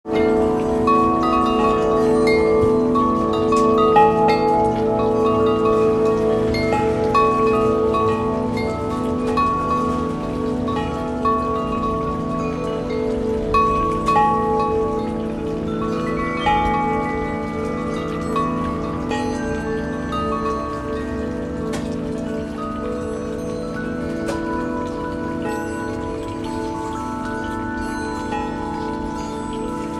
Soundscapes > Urban
Wind Chime Symphony
The sound of dozens of wind chimes on a windy day outside the Northwest Nature Shop in Ashland, OR. This includes their set of giant chimes that are over 10 feet tall. This is purely the wind with no human intervention! One of my favorite sounds on the planet.
bells, chimes, echo, windchimes